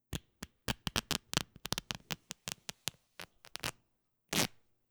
Sound effects > Objects / House appliances
Ripping Fabric, Tearing by hand
Tearing fabric apart by hand.
tearing, ripping, clothing, fabric, destruction, foley